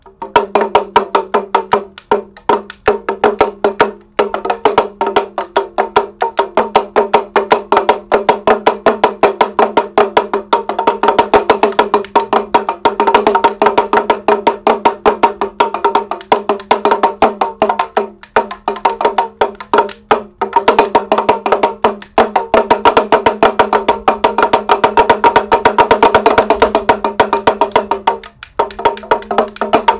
Music > Solo percussion
MUSCPerc-Blue Snowball Microphone, CU Damaroo, Rhythm Nicholas Judy TDC
A damaru rhythm.
Blue-brand, Blue-Snowball, buddhism, damaru, drum, hindi, hindu, hinduism, india, rhythm, tibet, tibetan